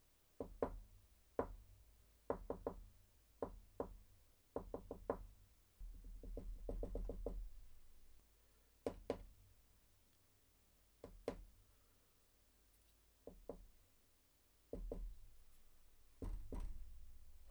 Sound effects > Other
knocking table used in a card game video